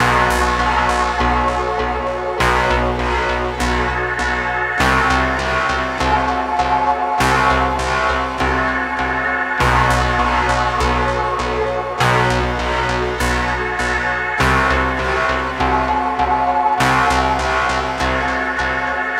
Music > Solo instrument
100 C Polivoks Brute 03
Soviet, Brute, Polivoks, Analog, Loop, Vintage, Electronic, Casio, 80s, Texture, Analogue, Melody, Synth